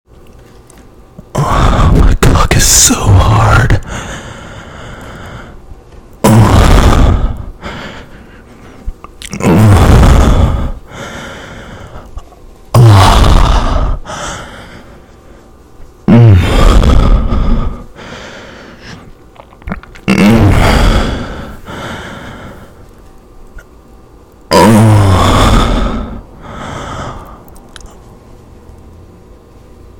Sound effects > Human sounds and actions
Deep moans and heavy breathing as I stroke right next to the mic. I was leaking by the end of this.